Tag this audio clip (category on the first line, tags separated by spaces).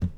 Sound effects > Objects / House appliances
clatter tip scoop metal knock plastic lid object kitchen water cleaning pour slam container pail garden fill shake foley debris clang spill hollow carry drop bucket handle tool liquid household